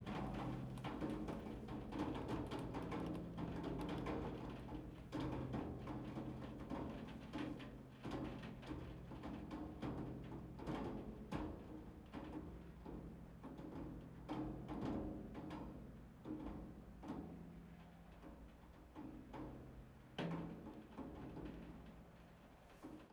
Soundscapes > Nature
Rain. Drip on window. Street
Drip, Rain, Street